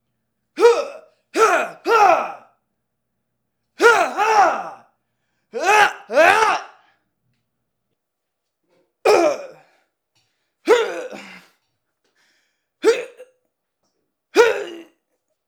Sound effects > Human sounds and actions
Henchman #6 Fight Vocalizations
Send us what you use it in! We'd love to see your work. Check it out here!
Henchman, gasp, enemy, punch, goon, fighting, combat, thug